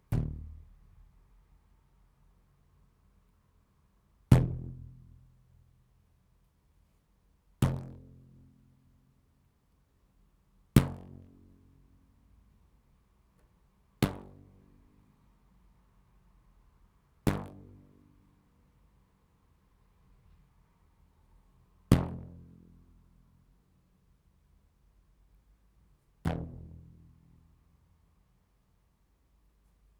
Sound effects > Objects / House appliances
A broken elastic band, stretched and plucked with fingers. Individual hits at different tensions, recorded via Zoom H2n, mid/side mode, in a small room.
elastic-band, twang, rubber-band, elastic, rubber, boing, pluck